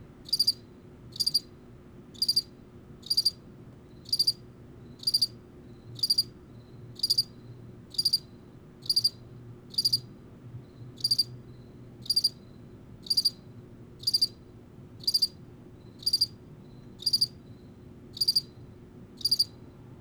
Sound effects > Animals
Zoom H1n, recorded in Colorado, Cricket recording